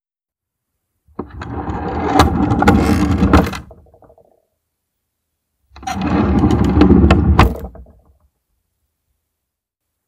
Sound effects > Other
Pulling open desk drawer and closing it
clank, desk, drawer, pull, push